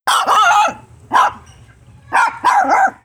Sound effects > Animals
Dogs - Chihuahua Barking, Close Perspective
Recorded with an LG Stylus 2022, this is the bark of the chihuahua dog. among whose iconic representatives include being in Taco Bell, Beverly Hill's Chihuahua, and Ren in Ren & Stimpy. Can be used for other small dog breeds.
pet, chihuahua, bark, dog, canine, puppy, dogs, woof, barking